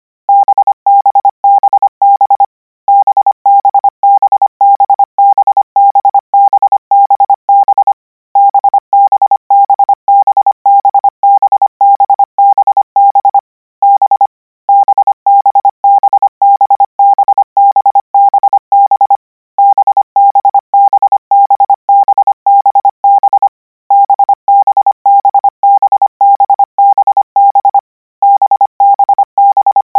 Sound effects > Electronic / Design
Koch 30 B - 200 N 25WPM 800Hz 90%
Practice hear letter 'B' use Koch method (practice each letter, symbol, letter separate than combine), 200 word random length, 25 word/minute, 800 Hz, 90% volume.
code, morse, letters